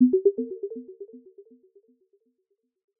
Sound effects > Electronic / Design
Pause Game (Gentle Echoes) 2
game-pause, game-pause-sound, hit-pause-button, pause, pause-alert-sound, pause-alert-sound-effects, pause-alter-sounds, pause-button, pause-computer-game, pause-game, pause-game-audio-effect, pause-game-button, pause-game-screen, pause-game-sound, pause-game-sound-effect, pause-game-sound-effects, pause-game-sounds, pause-the-game, pause-ui-navigation, pause-video-game, ui-navigation, ui-navigation-audio, ui-navigation-sound-effects, ui-navigation-sounds